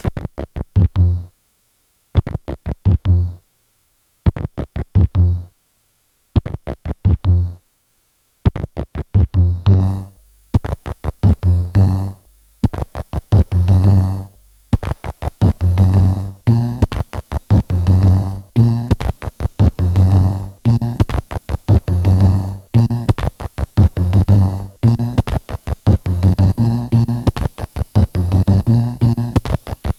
Sound effects > Human sounds and actions
Mouth Blowing Blip Blops Experimental Texture #003
Sounds out of a mouth whistling and blowing air through the lips. You can cut this in several ways or also use it as a basis for some granular synthesis. AI: Suno Prompt: atonal, low tones, experimental, bells, mouth, blip blops, echo, delays